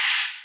Instrument samples > Percussion
China Yunnan 1
I used the: China crash: 19" Zildjian Z3 (but the sound is unrelated due to the many effects) I lowered/low-pitched the note. I EQed it on FL Studio and I applied Flangus. I drew its envelope on WaveLab cutting its attack and reshaping a better one.